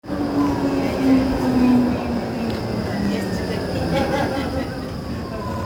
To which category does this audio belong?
Soundscapes > Urban